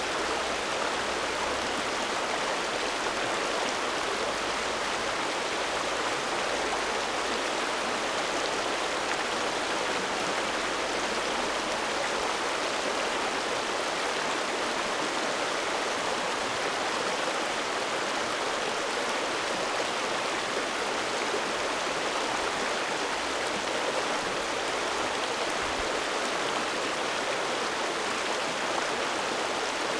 Sound effects > Natural elements and explosions
250712 01h27 ish Esperaza River middle bridge - Perpendicular to the rapids - MKE 600
Subject : Recording of the rapids 2-3m downstream of the middle bridge (Pont de Perpignan) in Esperaza. recording perpendicular to the flow of water. Sennheiser MKE600 with stock windcover P48, no filter. Weather : Clear sky, little wind. Processing : Trimmed in Audacity.